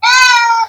Sound effects > Animals
Cat Meow
My cat Tinman made this sound. Recorded in May of 2024.
feline, meowing, cat, animal, meow, kitty, kitten